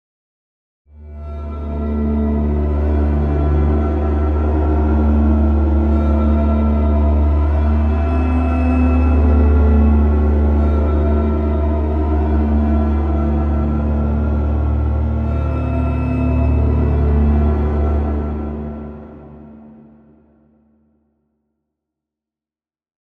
Sound effects > Electronic / Design
VT Lair, Flesh, D Low Oct Sting
Just a cinematic sting from Venus Theory's Auras: Lair collection. See title for the instrument name.
cinematic, creepy, dramatic, halloween, haunted, hit, spooky, Sting, suspense